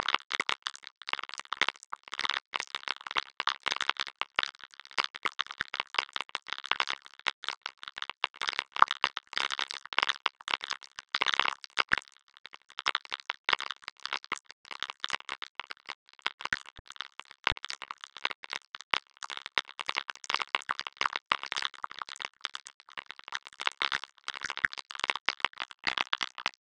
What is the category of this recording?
Sound effects > Electronic / Design